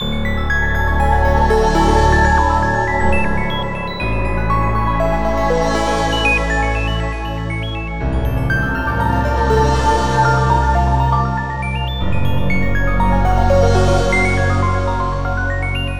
Music > Multiple instruments
Elysian Depths 2 (120 BPM 8 Bars)
120-bpm, Dylan-Kelk, gleaming-ocean, glittering-ocean, glorious-ocean, glorious-sea, Lux-Aeterna-Audio, ocean-documentary-music, ocean-documentary-theme, ocean-loop, ocean-music, ocean-soundtrack, ocean-theme, soothing-loop, swimming-theme, vast-ocean, water-level, water-level-music, water-level-theme, water-theme